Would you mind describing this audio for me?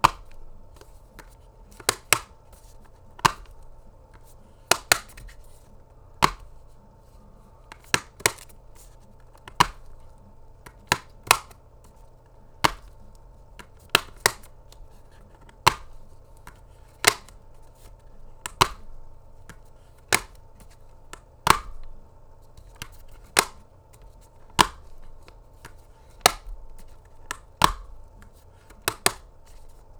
Sound effects > Objects / House appliances
FOLYProp-Blue Snowball Microphone DVD Case, Open, Close Nicholas Judy TDC
A DVD case opening and closing.
close, foley, Blue-Snowball, case, Blue-brand, open, dvd